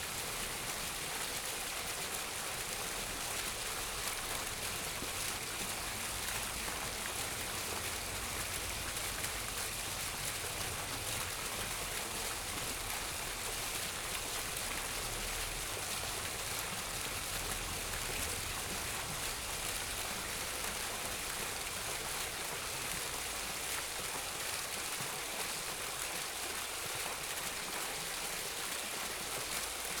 Sound effects > Objects / House appliances
fontana Trinità Monti
Recorded in Rome in front of the Barcaccia Fountain in Trinità dei Monti. Stereo with Zoom H4n.
water, Fountain, Rome